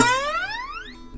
Music > Solo instrument
acoustic guitar slide5
chords, acosutic, strings, guitar, riff, instrument, pretty, dissonant, chord, knock, string, solo, twang, slap